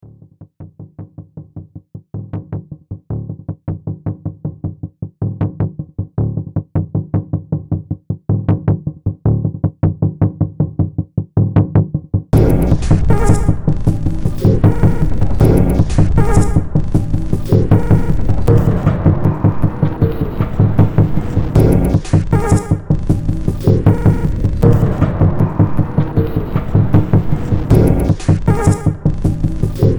Music > Multiple instruments
Demo Track #3038 (Industraumatic)
Sci-fi, Horror, Ambient, Industrial, Soundtrack, Cyberpunk, Underground, Noise, Games